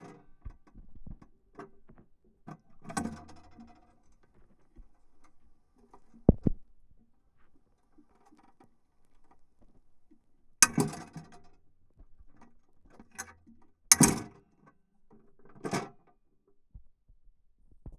Sound effects > Other
DOORMetl-Contact Mic Old metal door closing with lockers SoAM Sound of Solid and Gaseous Pt 1 Apartment
close, slam, closing, metal, shut, doors